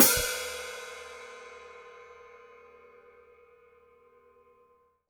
Music > Solo instrument
Vintage Custom 14 inch Hi Hat-007
Oneshot, Drums, Hat, Cymbal, Hats, Cymbals, Drum, Perc, Vintage, Metal, Custom, HiHat, Kit